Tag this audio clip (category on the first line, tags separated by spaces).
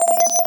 Sound effects > Electronic / Design
interface alert selection notification